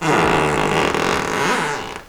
Sound effects > Objects / House appliances
Creaking Floorboards 09
floorboards, scrape, old-building, going, squeal, floorboard, weight, screech, rub, bare-foot, walk, wooden, floor, old, squeak, creaking, grind, footstep, groan, wood, footsteps, squeaky, heavy, creaky, room, walking, squeaking, grate, hardwood, flooring